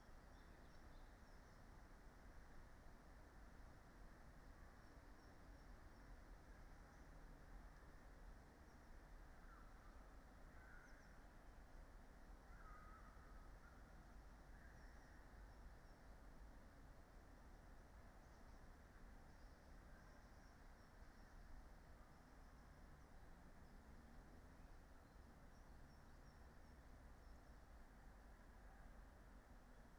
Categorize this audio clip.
Soundscapes > Nature